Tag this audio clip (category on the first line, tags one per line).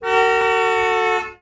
Sound effects > Vehicles
pass-by
horn
car
Phone-recording
doppler